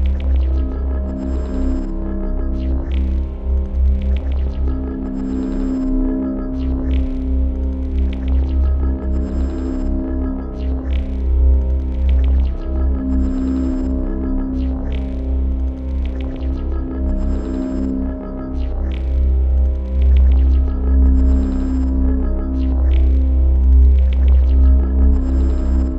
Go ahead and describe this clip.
Soundscapes > Synthetic / Artificial
Atonal Dark Gritty Ambient Soundscape Space Texture
Atonal dark sci-fi texture background done with the SpaceCraft granular synthesizer